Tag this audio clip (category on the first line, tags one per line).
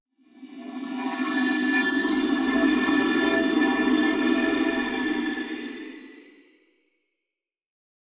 Sound effects > Electronic / Design
mid-tone
magiic
shimmering
reverse
slow
crystal